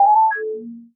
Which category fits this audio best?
Sound effects > Electronic / Design